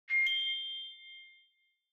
Sound effects > Electronic / Design
"Success" / "Level Passed" Videogame UI Sound
app, bells, blessing, boton, button, cheerful, click, final, game, glamour, glitter, glockenspiel, happy, interface, interfaz, juego, level, menu, metallic, musical, pickup, positive, positivo, pure, success, ui, up, ux, videogame, videojuego